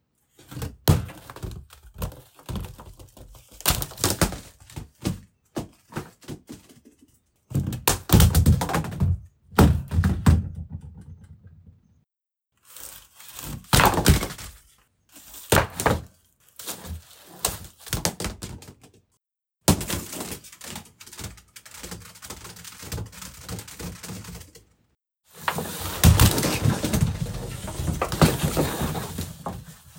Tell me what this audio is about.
Sound effects > Other
Baskets & Wicker - Havoc Bazaar
A mess is moved, pulled, and thrown roughly across a wooden floor. It includes various textures: baskets, fiber boxes with wood handles and wicker elements. I needed these sound effects to create chaos inside a ship. This one focuses on hard baskets, but you'll also find wood elements in another audio, brooms, metalic objects, cardboard boxes, and barrels. * No background noise. * No reverb nor echo. * Clean sound, close range. Recorded with Iphone or Thomann micro t.bone SC 420.